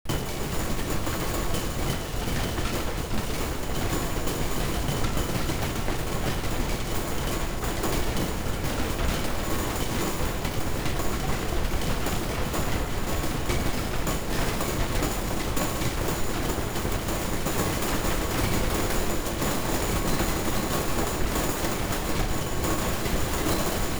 Soundscapes > Synthetic / Artificial
Grain Balls 1
effects, electronic, experimental, free, granulator, packs, royalty, sample, samples, sfx, sound, soundscapes